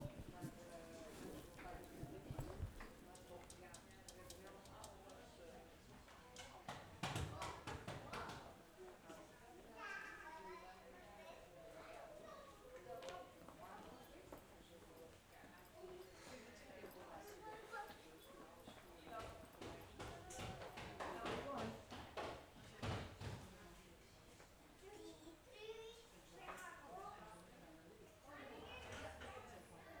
Soundscapes > Urban
Library point 002
Eindhoven public library, point 2 / / / 11:45 - 11:50. ...Wednesday November 12 2025!! Recorded with my ZOOM H5 Decibel: 39.4 - 48.9, quiet footsteps people occasionally scanning through the books and Frequent sounds: conversations between librarian and librarian or librarian to visitor, wheels of walking frames for old people